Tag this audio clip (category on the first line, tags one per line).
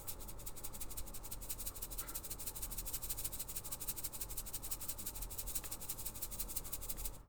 Sound effects > Animals
foley
simulation